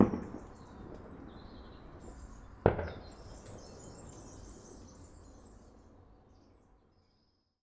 Sound effects > Other mechanisms, engines, machines
Two shots of distant gunfire. This was recorded in Provence, France. Wild boar are being hunted.